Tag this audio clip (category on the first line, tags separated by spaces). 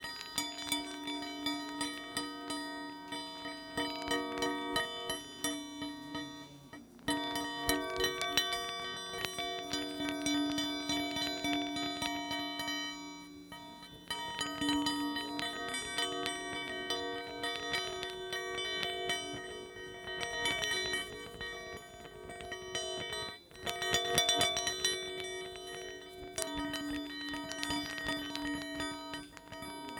Instrument samples > Other
baoding balls